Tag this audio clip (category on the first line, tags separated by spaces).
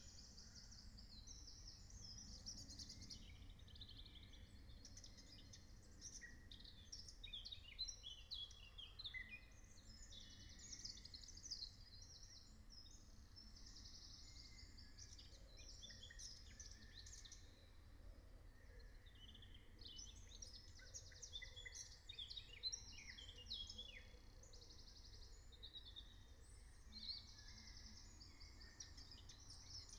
Soundscapes > Nature
meadow phenological-recording raspberry-pi soundscape